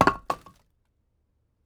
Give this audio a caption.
Sound effects > Natural elements and explosions
Throwing a log on a pile of logs XY 4
Subject : Pile of wood logs. Date YMD : 2025 04 22 Location : Inside a Barn Gergueil France. Hardware : Tascam FR-AV2, Rode NT5 in a XY configuration. Weather : Processing : Trimmed and Normalized in Audacity.
2025, FR-AV2, hitting, landing, Log, logs, NT5, plock, Rode, Tasam, throwing, Wood, Wooden, XY